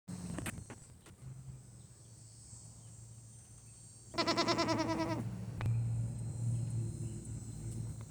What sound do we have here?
Sound effects > Animals
Goats - Soft Bleat, Close Perspective
Recorded with an LG Stylus 2022.